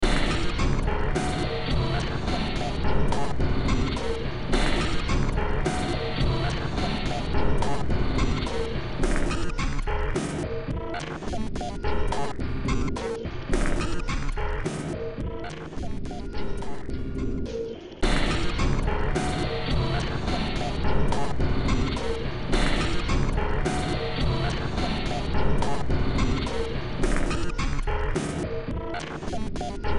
Music > Multiple instruments
Demo Track #3491 (Industraumatic)
Industrial, Noise, Soundtrack